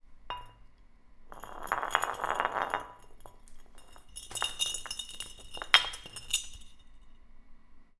Sound effects > Objects / House appliances
Glass bottle rolling 7
Bottle, Concrete, Floor, Glass, Rolling